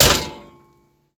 Sound effects > Objects / House appliances
shot-Bafflebanging-5
banging, impact